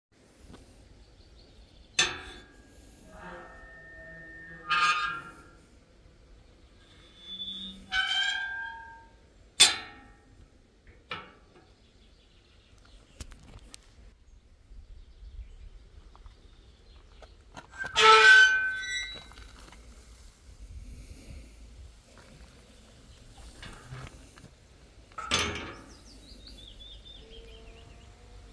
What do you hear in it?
Sound effects > Objects / House appliances
Unlocking, opening, and closing an old rusty gate leading to a cemetery in the Scottish countryside. Recorded on a Samsung S20.
clang, clunk, creak, creaking, creepy, door, gate, hinge, metal, metallic, rust, rusty, screech